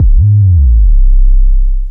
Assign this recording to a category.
Instrument samples > Synths / Electronic